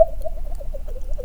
Sound effects > Objects / House appliances
knife and metal beam vibrations clicks dings and sfx-057
a collection of sounds made with metal beams, knives and utensils vibrating and clanging recorded with tascam field recorder and mixed in reaper
Beam, Clang, ding, Foley, FX, Klang, Metal, metallic, Perc, SFX, ting, Trippy, Vibrate, Vibration, Wobble